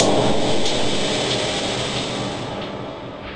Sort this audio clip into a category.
Sound effects > Electronic / Design